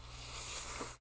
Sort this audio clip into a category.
Sound effects > Objects / House appliances